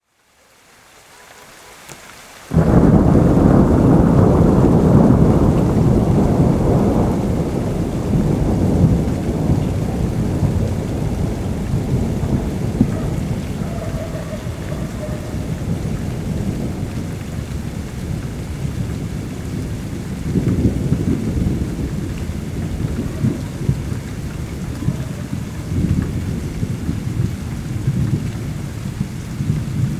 Soundscapes > Urban
14th September 2025 thunderstorm. Two thunders and rain. Recorded by SONY ICD-UX 512 stereo dictaphone.
field-recording, rain, storm, thunder, thunderstorm